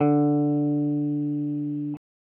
Instrument samples > String
Random guitar notes 001 D3 04
electric, guitar, stratocaster, electricguitar